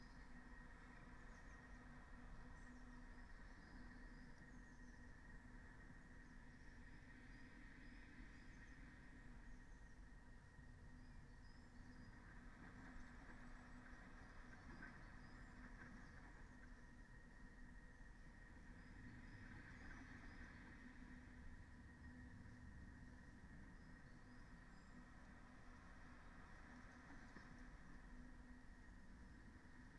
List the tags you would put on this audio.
Soundscapes > Nature
alice-holt-forest
phenological-recording
modified-soundscape
artistic-intervention
sound-installation
weather-data
field-recording
raspberry-pi
soundscape
nature
natural-soundscape
data-to-sound
Dendrophone

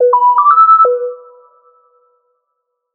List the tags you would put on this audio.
Instrument samples > Piano / Keyboard instruments
Sci-Fi
Strange
Unusual

